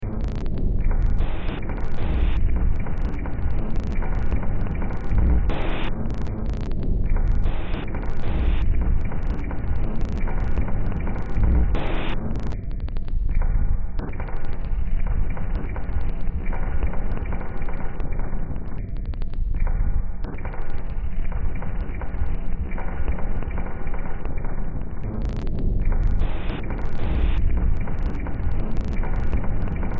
Music > Multiple instruments
Demo Track #3457 (Industraumatic)
Ambient, Cyberpunk, Games, Horror, Industrial, Noise, Sci-fi, Soundtrack, Underground